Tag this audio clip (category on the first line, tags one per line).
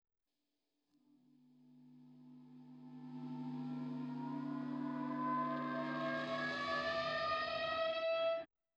Music > Other
guitar
riser
techno